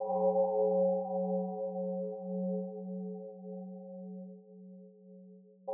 Instrument samples > Other
temple bowl
Sample of a genuine Tibetal temple/singing bowl in D. Useful for ambient drones.
Tibetan, Ambient, Drone, Bowl, Temple